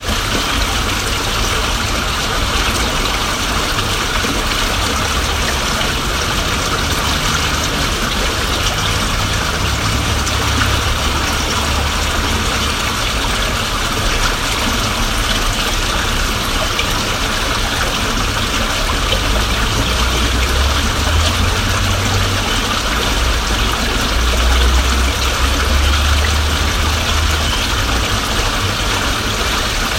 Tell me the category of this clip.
Soundscapes > Nature